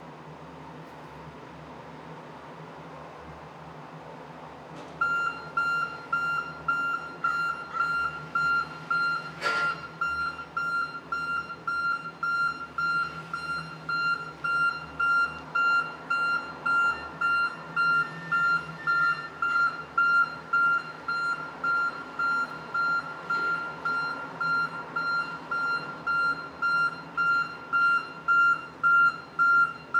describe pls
Soundscapes > Urban
A work van backing up for a while with a beeping alarm. Eventually a crow gets involved as well.
ambience, caw, city, crow, field-recording, traffic, urban, vehicle